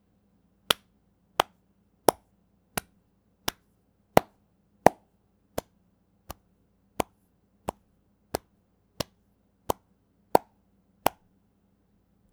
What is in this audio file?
Sound effects > Human sounds and actions
Porn slapping noises
The sounds of slapping noises as if two folks're getting it on. This was done by just softly clapping my hands. Recorded with a Fifine USB mic in my bedroom.
NSFW, Sex